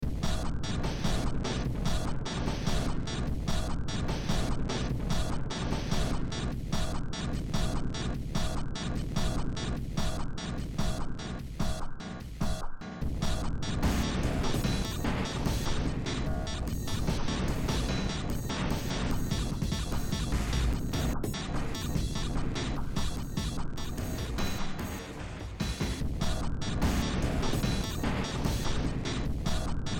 Music > Multiple instruments
Short Track #3759 (Industraumatic)
Cyberpunk, Games, Horror, Noise